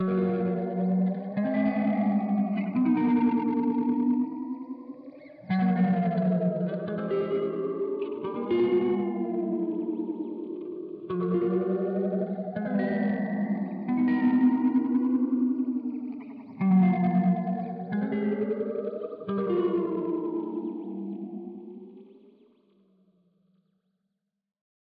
Music > Solo percussion
weirdy mockasin guitar style space alien 87bpm
I have fun playing with my old FAIM stratocoaster and my pedalboard. Only dreammy shoegazing noisy people gonna love it My pedalboard Behringer graphic eq700 Cluster mask5 Nux Horse man Fugu3 Dédalo Toxic Fuzz Retrohead Maquina del tiempo Dédalo Shimverb Mooer Larm Efectos Reverb Alu9 Dédalo Boss Phase Shifter Mvave cube baby 🔥This sample is free🔥👽 If you enjoy my work, consider showing your support by grabbing me a coffee (or two)!
bizarre, chorus, dark, dream, dreamlike, experimental, guitar, lofi, psychedelic, weird